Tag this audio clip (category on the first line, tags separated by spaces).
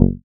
Instrument samples > Synths / Electronic
additive-synthesis fm-synthesis bass